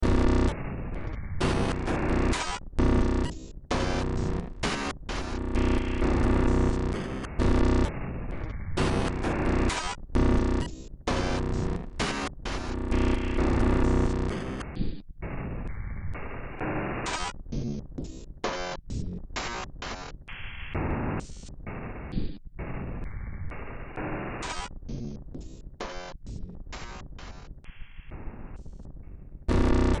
Music > Multiple instruments
Demo Track #3900 (Industraumatic)
Ambient, Horror, Noise, Sci-fi, Soundtrack